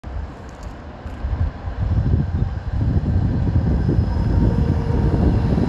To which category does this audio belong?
Soundscapes > Urban